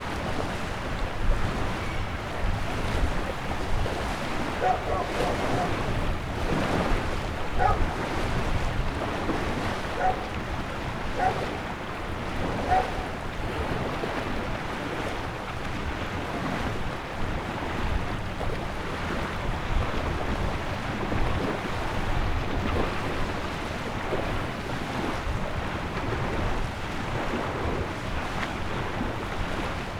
Soundscapes > Nature
Ambient recording in a lake shore. Medium wind. Some seagulls and dogs might be heard.